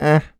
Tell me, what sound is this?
Speech > Solo speech
Doubt - Ehh
dialogue, doubt, FR-AV2, Human, Male, Man, Mid-20s, Neumann, NPC, oneshot, singletake, Single-take, skeptic, skepticism, talk, Tascam, U67, Video-game, Vocal, voice, Voice-acting